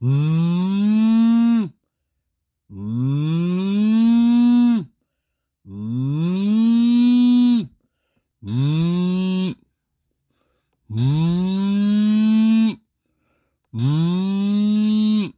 Sound effects > Animals
TOONAnml-Samsung Galaxy Smartphone, CU Kazoo, Imitation, Cow Moo Nicholas Judy TDC
A kazoo imitating a cow mooing.